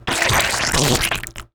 Sound effects > Human sounds and actions
Artificial Liquid Slop 1
Had a bunch of saliva in my mouth and I wanted to record something gross, so I recorded several layers and put them together. I was inspired by the overly-liquidy cum sounds from hentai that I've watched. Which, in this case, this would probably be great for an intimate scene in the moment of climax. This could also be a good use in horror aspects as well if you'd like. Recorded with a Blue Yeti Microphone and mixed with Audacity. I might make a Twitter or Bluesky or something, if you guys want.
artificial, climax, cum, disgusting, filthy, gore, gross, horror, human, intimate, liquid, nsfw, sex, slop, smush, smut, substance, watery